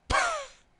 Sound effects > Natural elements and explosions
Air puff SFX for a tiny airy explosion. Created using a Blue Yeti Microphone and edited in Audition.